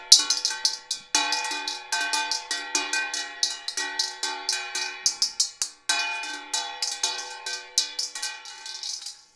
Sound effects > Objects / House appliances
quarter in bowl
I recorded a quarter bouncing around in a metal bowl. I do not remember what I recorded it with.
skitch, bowl, metal-bowl, skid, bouncing, coin, ching, metallic, metal, quarter